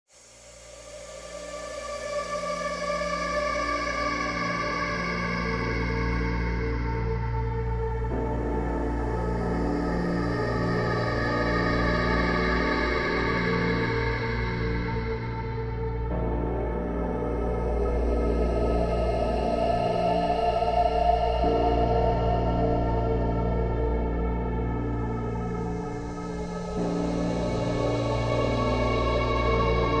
Music > Multiple instruments
Horror scary background music
Halloween, Horror, Background, creepy, Freak, Nightmare, Ghost, Music, dark, Evil, fear, Soundtrack, scary, Sound